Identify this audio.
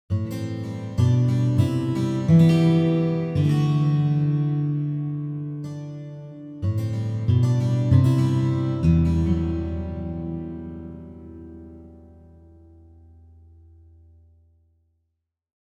Music > Solo instrument
Reflective Guitar Chords #1 (Less Reverb)
guitar-ambience, slow-guitar, morning-guitar, reflective-guitar, guitar-instrumental, thoughtful-guitar, solo-guitar, soothing-guitar, soulful-guitar, soft-guitar, sentimental-guitar, guitar-transition, guitar-motif, guitar-chords, acoustic-guitar